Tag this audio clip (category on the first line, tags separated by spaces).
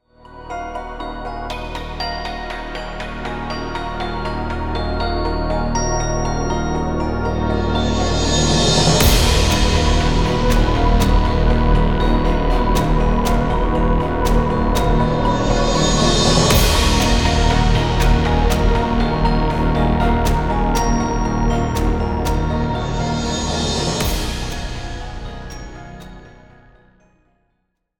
Music > Multiple instruments
climactic-epic-theme epic-climax epic-climax-song epic-trailer-music epic-trailer-theme fantasy-epic fantasy-trailer-theme intense-trailer-theme powerful trailer-score trailer-theme